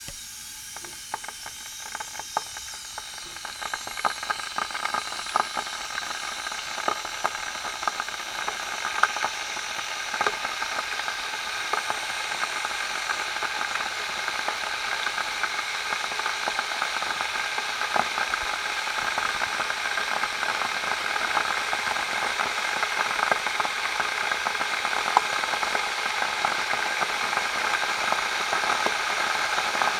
Sound effects > Objects / House appliances
the sound of boiling water in an electric kettle. Recorded on the zoom H1n recorder